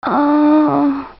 Instrument samples > Other
Ableton Live. VST. Abstract Vox......Oh sexy girl Free Music Slap House Dance EDM Loop Electro Clap Drums Kick Drum Snare Bass Dance Club Psytrance Drumroll Trance Sample .